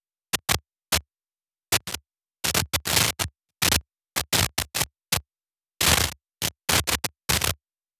Sound effects > Objects / House appliances
12 - Bonus - Sparks Flying
Crackling fuse box. Multimeter needed.
electrical, electronic, spark, fuse-box, sparks, broken, electricity, fuse